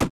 Sound effects > Other
gun shot
A single shot for a gun. Made by a paper bag popping and some editing in Adobe Audition.
shot, gunshot, gun, shoot, firing, warfare, shooting, weapon